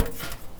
Sound effects > Other mechanisms, engines, machines
Handsaw Oneshot Metal Foley 11
foley, fx, handsaw, hit, household, metal, metallic, perc, percussion, plank, saw, sfx, shop, smack, tool, twang, twangy, vibe, vibration